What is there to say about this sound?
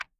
Sound effects > Other mechanisms, engines, machines
clock tickC1
single tick, isolated Works best in tandem with the paired sound (ie: clock_tickC1 and clock_tickC2) for the back and forth swing.
clacking, clock, hand, seconds, time